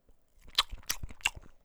Sound effects > Human sounds and actions
Eating a Wet Food
Recording of me loudly eating some food.